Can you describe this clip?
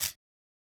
Sound effects > Objects / House appliances
Ribbon Tie 4 Rattle
Tying a satin ribbon , recorded with a AKG C414 XLII microphone.
ribbon, satin-ribbon, tying